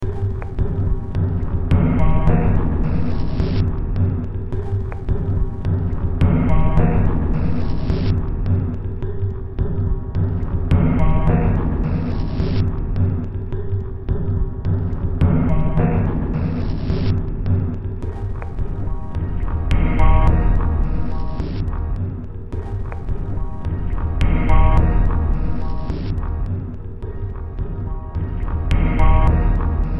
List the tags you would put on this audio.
Music > Multiple instruments
Games
Soundtrack

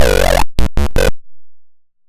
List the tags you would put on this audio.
Sound effects > Electronic / Design
Otherworldly
Scifi
Electro
Sweep
Spacey
Noise
Electronic
DIY
Instrument
Sci-fi
Theremins
Infiltrator
Analog
Experimental
Trippy
Glitch
Alien
Optical
Robotic
noisey
Synth
Bass
Dub
Robot
Theremin
Handmadeelectronic
Digital
Glitchy
FX
SFX

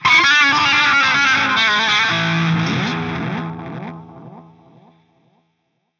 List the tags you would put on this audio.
Instrument samples > String
distorted
electric
guitar

